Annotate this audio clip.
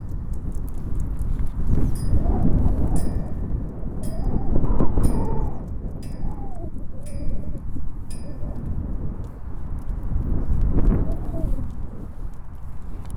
Soundscapes > Nature
Tetherball chain being blown by the wind
field, recording, TascamDr05X, wind